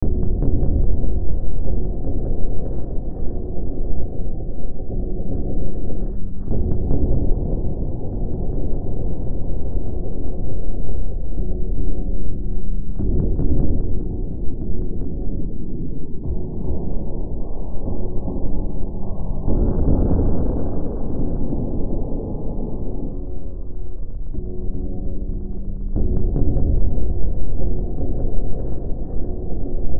Soundscapes > Synthetic / Artificial
Looppelganger #147 | Dark Ambient Sound
Use this as background to some creepy or horror content.
Survival, Ambient, Hill